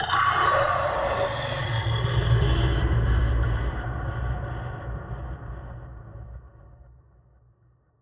Sound effects > Experimental
Creature Monster Alien Vocal FX-8
From a collection of creature and monster alien sfx fx created by my throat singing in my studio and processing with a myriad of vsts effects in Reaper, including infiltrator, fabfilter reverb, shaperbox, and others